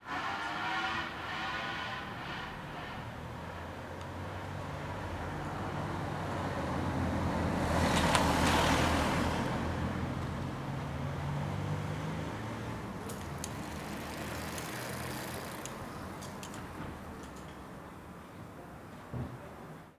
Soundscapes > Urban
Splott - Distance Scrambler Cars Bikes - Railway Street
fieldrecording, splott, wales